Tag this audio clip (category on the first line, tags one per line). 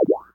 Sound effects > Experimental
vintage
retro
electro
robot
sweep
effect
analog
korg
machine
scifi
bass
sci-fi
basses
mechanical
sfx
electronic
bassy
synth
weird
pad
snythesizer
fx
sample
robotic
oneshot
dark
analogue
complex
trippy
alien